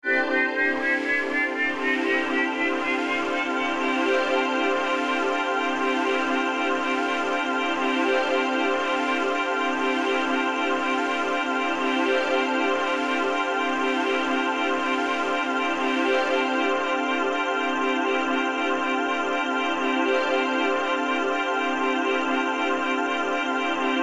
Soundscapes > Synthetic / Artificial
Light aura thing
This is some kind of noise thing that was made to embody the feeling of light/brightness. It features a very high pitch and...... idk things that sound kind of like light idk how else to describe it. Use this for some kind of light based power/attack or whenever else you would need this
aura, bright, brightness, hum, humming, light, power, supernatural